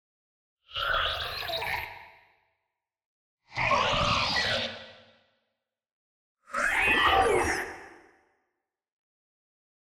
Sound effects > Other
SciFi-Alien Language
That sound from my imagination, made with Vital. If it inspires you, save your project. Enjoy!